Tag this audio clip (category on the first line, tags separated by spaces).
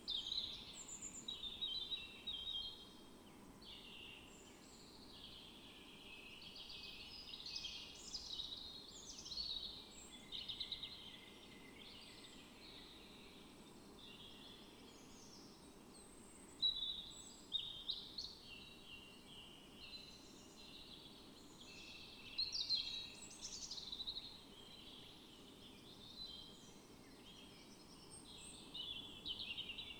Soundscapes > Nature
data-to-sound field-recording natural-soundscape weather-data modified-soundscape Dendrophone artistic-intervention sound-installation raspberry-pi alice-holt-forest phenological-recording soundscape nature